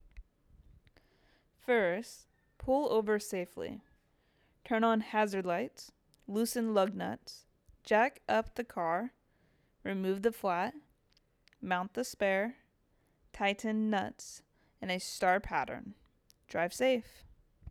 Speech > Solo speech
How to Change a Tire
A fast, practical guide to changing a car tire safely. Good for driving courses, safety demos, or automotive tutorials. Script: "First, pull over safely. Turn on hazard lights. Loosen lug nuts, jack up the car, remove the flat, mount the spare, tighten nuts in a star pattern. Drive safe!"
HowTo, ChangeATire, SafetyFirst, CarTips, PublicService, AutoSkills, LifeHacks